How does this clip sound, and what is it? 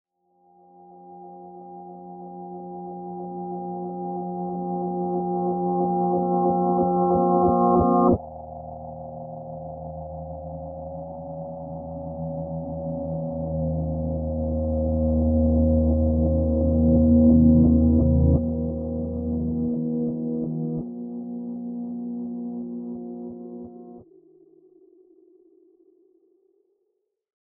Music > Other
Two connected but different rising, reversed drones. The first has a sudden stop, the second ends more gradually. Made in FL Studio with guitar and a Boss DM-2 + some mixing.